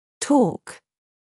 Speech > Solo speech
to talk
english, voice, word, pronunciation